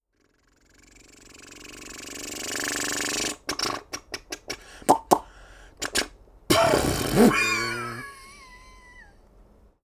Sound effects > Vehicles
TOONVeh-Blue Snowball Microphone, CU Jalopy, Approach, Stop, Comedic Nicholas Judy TDC
A comedic jalopy approaching and stopping.
comedic, Blue-brand, cartoon, jalopy, Blue-Snowball, approach, stop